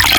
Instrument samples > Percussion
peedrum brief
• I drew the waveform's envelope to be a staircase/stepped/escalator fade out = terracefade downtoning. tags: percussion percussive water pee peedrum drum drums crash alienware UFO glass pouring liquid wet aqua fluid H2O moisture rain drizzle dew stream flow tide wave current droplet splash
alienware, aqua, crash, current, dew, drizzle, droplet, drum, drums, flow, fluid, glass, H2O, liquid, moisture, pee, peedrum, percussion, percussive, pouring, rain, splash, stream, tide, UFO, water, wave, wet